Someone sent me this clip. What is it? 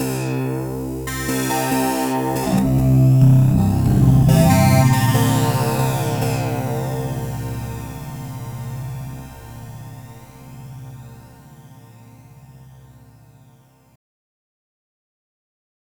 Instrument samples > Synths / Electronic
Deep Pads and Ambient Tones13
From a collection of 30 tonal pads recorded in FL Studio using various vst synths
Synthesizer, Haunting, Digital, Dark, bass, synthetic, bassy, Pad, Note, Synth, Ambient, Ominous, Tones, Oneshot, Chill, Deep, Pads, Analog, Tone